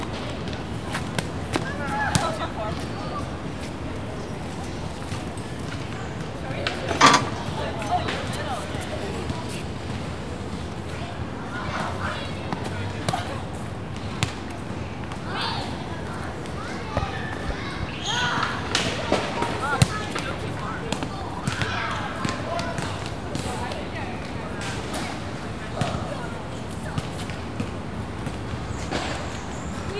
Soundscapes > Urban
Volleybal at park
Young people playing volleyball at Seward Park, they kept failing at spiking but were clearly having fun.
park, field, recording